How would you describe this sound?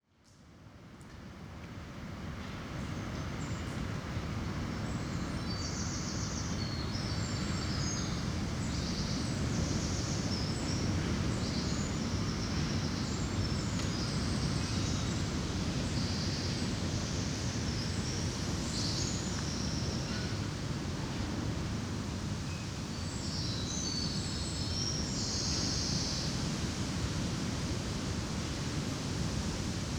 Soundscapes > Urban
INDUSTRIAL AMBIENT WIND kengwai cct
Ambient recording from an abandoned plant in the Charleroi region (25/5/25). Recorded by two Earsight standard Immersive Soundscapes microphones and a Sound Devices Mixpre6.